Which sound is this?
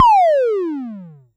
Instrument samples > Synths / Electronic
C BassPow OneShot 01
music, Electronic, Bass, Drum, Analog, Mod, Modified, Synth, Kit, 606, Vintage, DrumMachine, HiHat